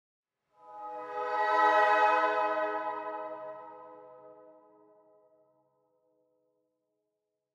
Music > Other
Mystical female choir swell 01
A mystical sounding choir swelling with lots of reverb. Made with real human voices in FL Studio, recorded a Shure SM57. After the swell of stacked vocals, there is a long trailing off.